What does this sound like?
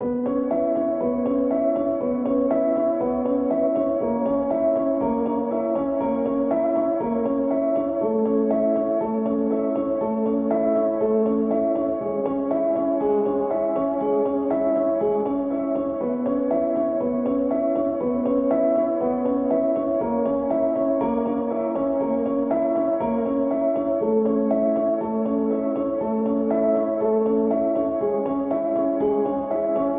Music > Solo instrument
Piano loops 046 efect 4 octave long loop 120 bpm
120, 120bpm, free, loop, music, piano, pianomusic, reverb, samples, simple, simplesamples